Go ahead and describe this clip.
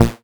Instrument samples > Synths / Electronic
CINEMABASS 4 Bb
additive-synthesis, bass, fm-synthesis